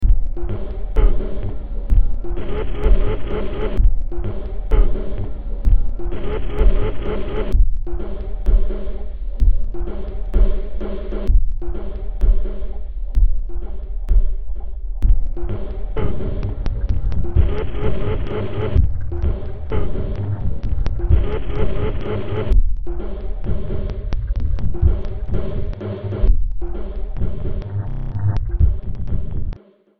Music > Multiple instruments
Demo Track #2992 (Industraumatic)
Ambient, Horror, Industrial, Noise, Sci-fi, Soundtrack, Underground